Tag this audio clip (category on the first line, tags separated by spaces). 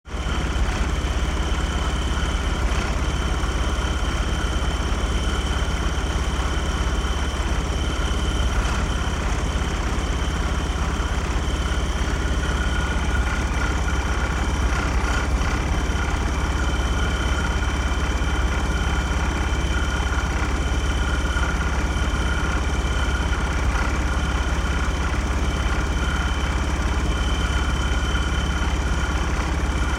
Sound effects > Other mechanisms, engines, machines
engine machine motor motor-cycle